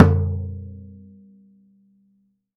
Music > Solo instrument
Low Floor Tom Sonor Force 3007-001
Perc, Ride, GONG, Metal, Kit, FX, Crash, Hat, Sabian, Percussion, Custom, Oneshot, Drum, Paiste, Cymbals, Cymbal, Drums